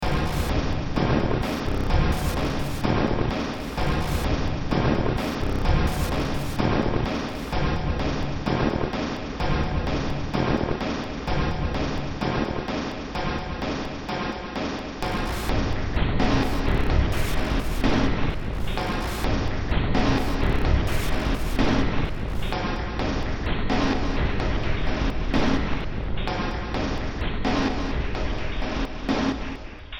Music > Multiple instruments
Demo Track #3336 (Industraumatic)
Track taken from the Industraumatic Project.
Horror, Games, Sci-fi, Underground, Ambient, Industrial, Noise, Soundtrack, Cyberpunk